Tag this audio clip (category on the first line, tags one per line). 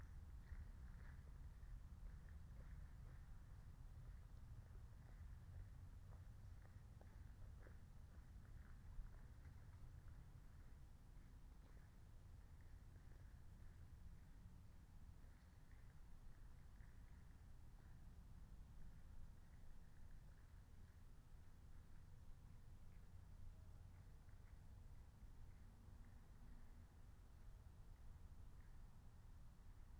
Soundscapes > Nature
phenological-recording
meadow
soundscape
raspberry-pi
field-recording
natural-soundscape
alice-holt-forest
nature